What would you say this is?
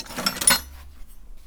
Sound effects > Other mechanisms, engines, machines
metal shop foley -071
bang, oneshot, perc, pop, strike, tools